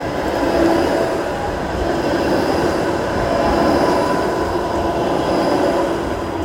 Sound effects > Vehicles
tram-apple-9
tram, tramway, vehicle, outside